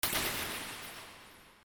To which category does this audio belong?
Sound effects > Electronic / Design